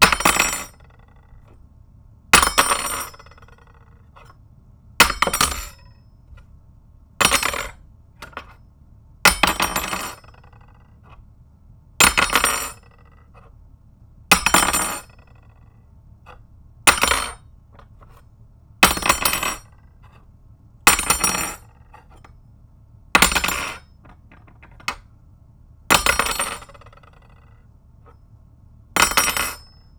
Sound effects > Objects / House appliances
FOODTware-Blue Snowball Microphone Spoon, Drop to Floor Nicholas Judy TDC
A spoon being dropped to the floor.